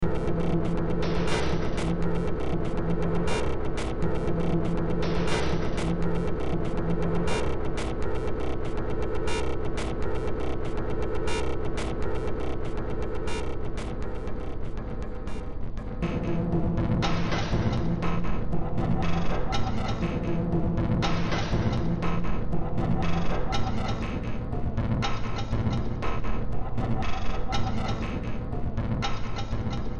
Music > Multiple instruments
Demo Track #3854 (Industraumatic)
Games, Sci-fi, Industrial, Ambient, Noise, Cyberpunk, Soundtrack, Underground, Horror